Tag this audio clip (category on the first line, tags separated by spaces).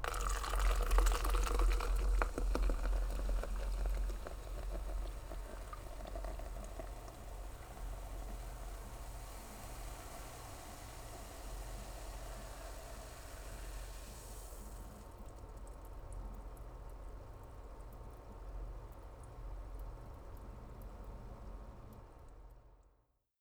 Sound effects > Objects / House appliances
Blue-brand Blue-Snowball bubbling can carbonated cup fizz foley plastic pour soda soda-can